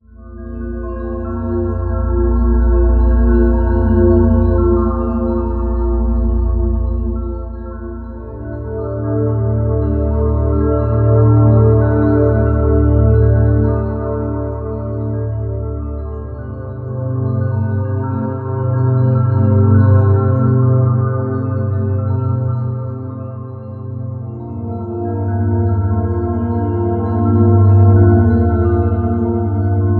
Music > Multiple instruments
Heavenly Gate (Ambience) 2
holy-spell, good-vibes, meditation, portal-hum, holy-magic-aura, soothing-meditation-loop, deep-meditation, mystic-gate-ambience, white-magic-aura, mystic-gate-resonance, magic-aura, meditation-background-music, meditative-music, holy-area-of-effect, healing-spell, holy-resonance, portal-humming, meditative-vibe, heal-magic, magic-portal-humming, holy-aoe, area-of-effect, sacred-meditation, holy-aura, magic-portal-hum, divine-aura